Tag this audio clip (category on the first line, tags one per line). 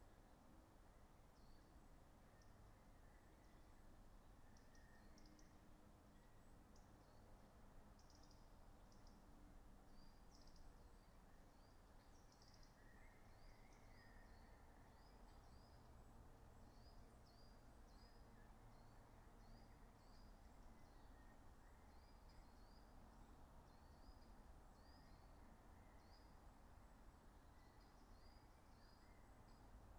Soundscapes > Nature
artistic-intervention
alice-holt-forest
sound-installation
nature
soundscape
field-recording
modified-soundscape
natural-soundscape
raspberry-pi